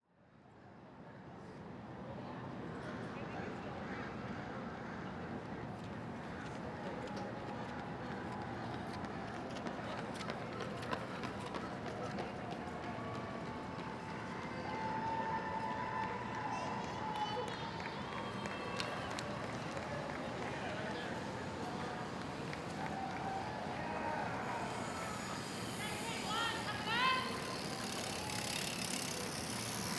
Soundscapes > Urban
People running the 2025 Portland Marathon which began shortly after the runners in the half marathon passed by. Recorded near the start so everyone is still packed together, easily several thousand runners passing by.
ambience, city, crowd, event, field-recording, footsteps, people, running, street, traffic, urban